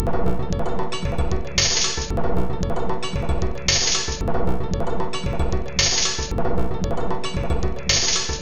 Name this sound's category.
Instrument samples > Percussion